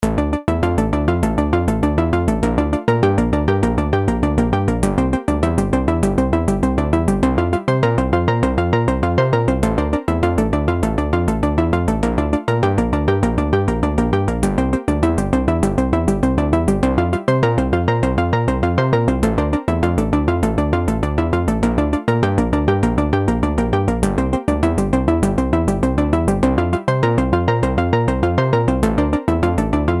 Music > Multiple instruments
Ableton Live. VST.....Imposcar, Surge......Musical Composition Free Music Slap House Dance EDM Loop Electro Clap Drums Kick Drum Snare Bass Dance Club Psytrance Drumroll Trance Sample .
Drums, EDM, Snare, Slap, Free, Bass, House, Loop, Dance, Electro, Musical, Clap, Kick, Music, Composition, Drum